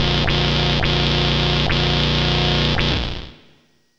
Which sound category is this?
Instrument samples > Synths / Electronic